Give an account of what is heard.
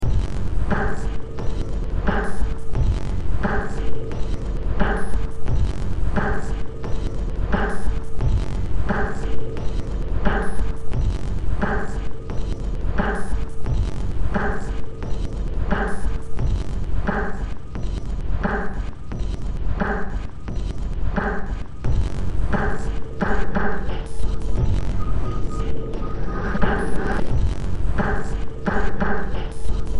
Music > Multiple instruments
Demo Track #3591 (Industraumatic)
Ambient, Cyberpunk, Games, Horror, Industrial, Noise, Sci-fi, Soundtrack, Underground